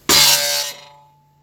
Sound effects > Objects / House appliances
shot-Bafflebanging-4
Banging and hitting 1/8th inch steel baffles and plates
impact, metal, banging